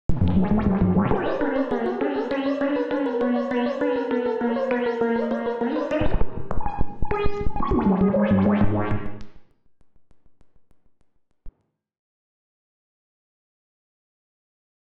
Sound effects > Electronic / Design
Optical Theremin 6 Osc Shaper Infiltrated-010
SFX, Machine, Crazy, Saw, Alien, Tone, Otherworldly, Loopable, Pulse, Experimental, Chaotic, Electro, DIY, Oscillator, EDM, Robot, Weird, Analog, IDM, Synth, Electronic, Theremin, Robotic, Mechanical, FX, strange, Gliltch, Noise, Impulse